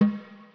Music > Solo percussion
Snare Processed - Oneshot 195 - 14 by 6.5 inch Brass Ludwig
sfx, reverb, realdrums, roll, percussion, realdrum, hits, drumkit, brass, rimshot, flam, rimshots, processed, ludwig, snaredrum, snare, kit, crack, snares, oneshot